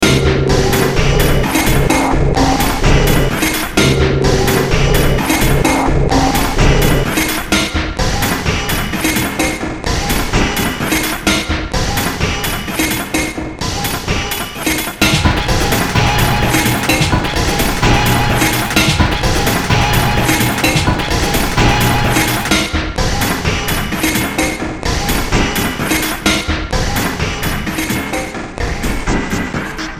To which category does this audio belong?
Music > Multiple instruments